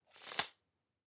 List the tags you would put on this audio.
Sound effects > Other mechanisms, engines, machines
garage
rustle

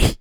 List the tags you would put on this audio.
Speech > Solo speech
male
voice
Exhalation

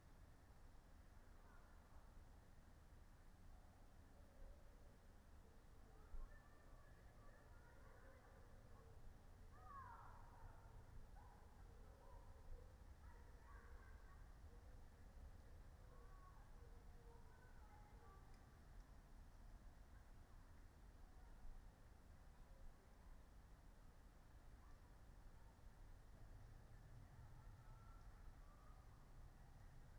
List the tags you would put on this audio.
Soundscapes > Nature
natural-soundscape
field-recording
raspberry-pi
sound-installation
alice-holt-forest
Dendrophone
weather-data
artistic-intervention
nature
modified-soundscape
phenological-recording
data-to-sound
soundscape

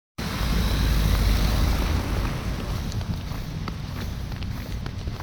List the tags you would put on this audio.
Soundscapes > Urban
Car
passing
studded
tires

